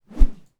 Sound effects > Natural elements and explosions
Stick - Whoosh 8
swinging, oneshot, stick, tascam, Transition, NT5, whosh, SFX, one-shot, fast, Rode, FR-AV2, Woosh, Swing, whoosh